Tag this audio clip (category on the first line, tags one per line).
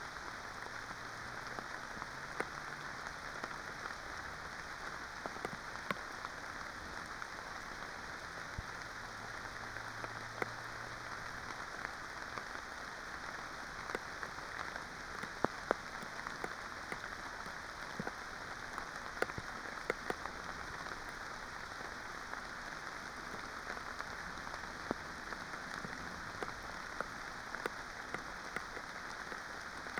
Soundscapes > Nature
soundscape
modified-soundscape
natural-soundscape
alice-holt-forest
sound-installation
Dendrophone
phenological-recording
weather-data
field-recording
raspberry-pi
nature
artistic-intervention
data-to-sound